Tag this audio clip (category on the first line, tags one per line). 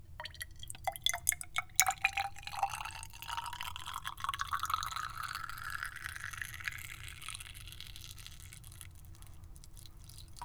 Sound effects > Objects / House appliances
clunk
stab
glass
perc
fx
mechanical
object
percussion
sfx
industrial
metal
foundobject
natural
drill
hit
oneshot
fieldrecording
foley
bonk